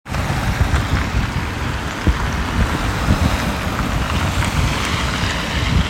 Sound effects > Vehicles
Recording of a car near a roundabout in Hervanta, Tampere, Finland. Recorded with an iPhone 14.
outside, car, vehicle, automobile